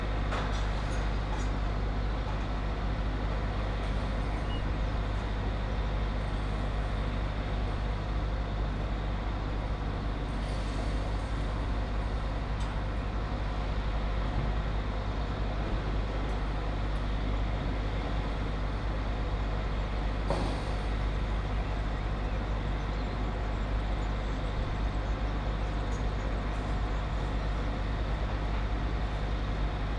Soundscapes > Urban
Exterior ambience of a work building. Birds around. Recorded from the balcony at the 5th floor of the Gran Hotel in Peñíscola (Spain), uneven room (not with sea view). Sony PCM-M10 (with Rycote's windfur). ··································································· Ambiente Exterior de una obra. Pájaros piando. Grabado desde el balcón del quinto piso del Gran Hotel de Peñíscola (España), habitación impar (no da al mar). Sony PCM-M10 (con el antiviento de Rycote).